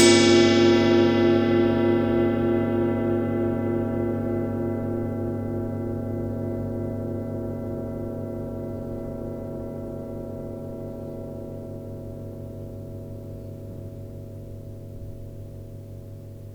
Instrument samples > Other
tibetan bowls tibetan bowls Recorded with sounddevices mixpre with usi microphones